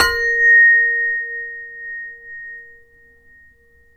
Sound effects > Other mechanisms, engines, machines
metal shop foley -077
boom, crackle, perc, percussion, strike, tink, tools, wood